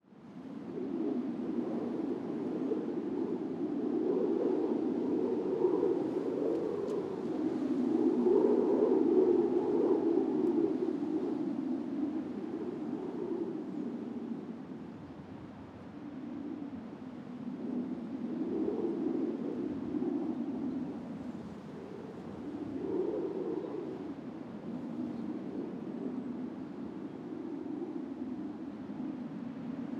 Sound effects > Natural elements and explosions
Gusts on overhead power lines.
lines, line, wire, gale, power, blowing, field-recording, overhead, gusts, howling, gust, wind, storm, windy